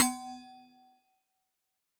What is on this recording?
Sound effects > Objects / House appliances
Resonant coffee thermos-005
percusive, recording, sampling